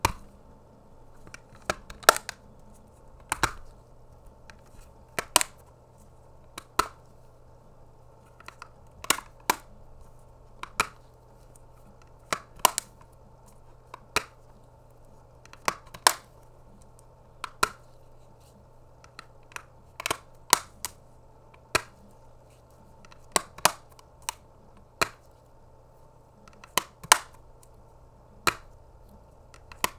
Sound effects > Objects / House appliances
A nintendo switch game case opening and closing.